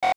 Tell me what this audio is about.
Sound effects > Electronic / Design
Error Bleep
A short, chopped 'beep' sound, great for UI sfx.
sfx, computer, digital, beep, bleep, blip, game, glitch, electronic, ui, sound-design